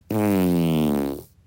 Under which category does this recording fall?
Sound effects > Other